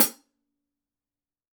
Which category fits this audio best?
Music > Solo instrument